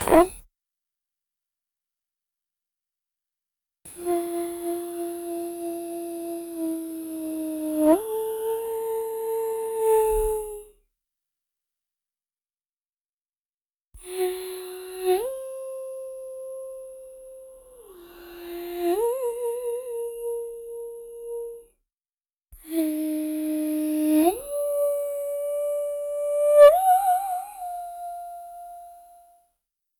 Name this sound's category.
Sound effects > Human sounds and actions